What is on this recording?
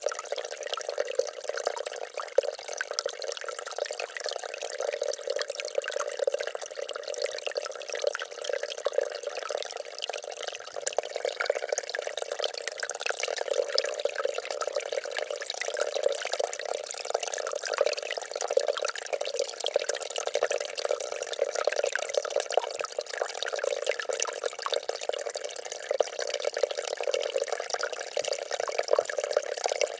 Soundscapes > Nature
Deep Base Marble Stream Small
water, trickle, river, waterstream, stream, drops